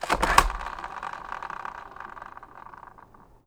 Sound effects > Objects / House appliances
TOYMech-Blue Snowball Microphone, CU See 'N' Say, Lever Pull, Arrow Spin Release Nicholas Judy TDC
A See 'N' Say toy lever pull and arrow spin release. No electronic noises.
arrow, foley, lever, pull, spin, toy